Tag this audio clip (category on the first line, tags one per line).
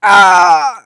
Sound effects > Human sounds and actions
Human
Hurt